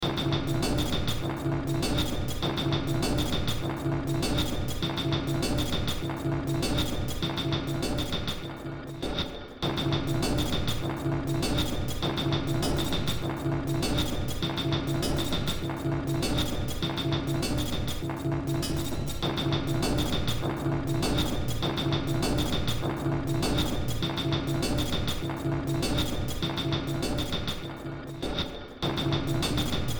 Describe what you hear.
Music > Multiple instruments
Short Track #3547 (Industraumatic)
Ambient, Cyberpunk, Games, Horror, Industrial, Noise, Sci-fi, Soundtrack, Underground